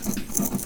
Sound effects > Other mechanisms, engines, machines
grinder wire brush foley-003
Bristle, Brush, Brushing, Foley, fx, Household, Mechanical, Metallic, Scrape, sfx, Shop, Tool, Tools, Woodshop, Workshop